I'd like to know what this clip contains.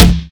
Instrument samples > Percussion
This tom is part of the Tama Star Classic Bubinga Tomset (every tom is in my tom folder). I uploaded the attacked and unattacked (without attacks) files. → tom 1 (hightom): 9×10" Tama Star Classic Bubinga Quilted Sapele • tom 2 (midtom): 10×12" Tama Star Classic Bubinga Quilted Sapele • tom 3 (lowtom): 14×14" Tama Star Classic Bubinga Quilted Sapele • floor 1 (lightfloor): 16×16" Tama Star Classic Bubinga Quilted Sapele • floor 2 (deepfloor): 14×20" Tama Star Classic Bubinga Gong Bass Drum